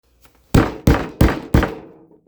Sound effects > Objects / House appliances
Sound of fist slamming desk.